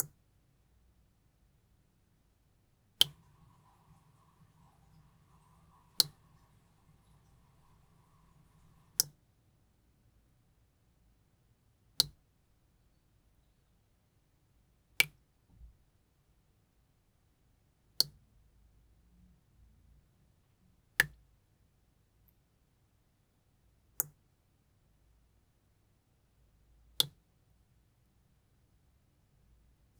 Sound effects > Objects / House appliances
Water dripping from a leaking boiler
Water drops from a leaking domestic boiler, being caught in a partially filled plastic bowl. Recorded in XY stereo on a Zoom H2 and edited with noise reduction applied in Audacity.
drip, dripping, drop, droplet, indoors, leak, tap, water